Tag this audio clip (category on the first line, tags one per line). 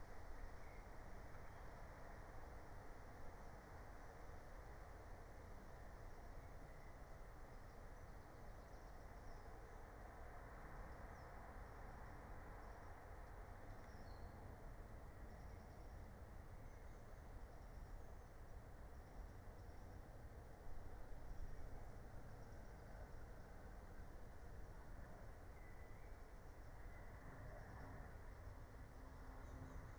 Soundscapes > Nature
soundscape
field-recording
natural-soundscape
raspberry-pi